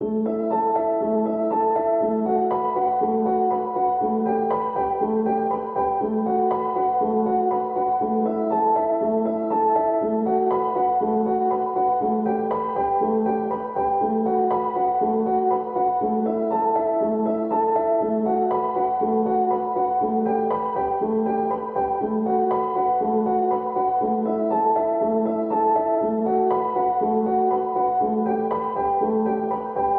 Music > Solo instrument
Piano loops 089 efect 4 octave long loop 120 bpm
music, free, reverb, pianomusic